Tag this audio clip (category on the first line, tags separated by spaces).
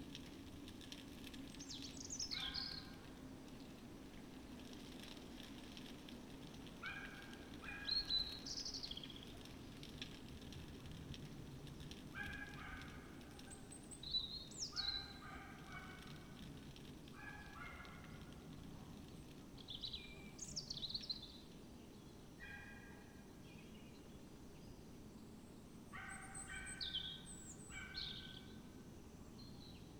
Soundscapes > Nature
sound-installation field-recording modified-soundscape Dendrophone raspberry-pi soundscape natural-soundscape artistic-intervention phenological-recording alice-holt-forest weather-data data-to-sound nature